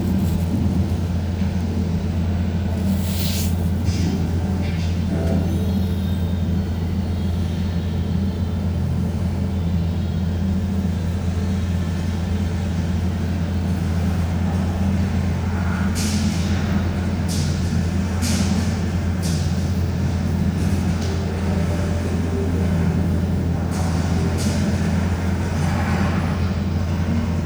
Soundscapes > Indoors
Someone cutting glass and fusing it in a workshop. Recorded on phone.